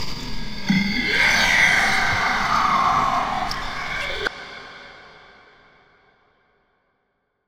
Sound effects > Experimental
Creature Monster Alien Vocal FX-59
gamedesign, Vox, Frightening, evil, Monster, Growl, gutteral, Echo, devil, Alien, boss, Snarling, scary, Snarl, Fantasy, Groan, Otherworldly, Deep, Creature, Sounddesign, sfx, visceral, Vocal, Sound, Monstrous, Animal, Ominous, Reverberating, demon, fx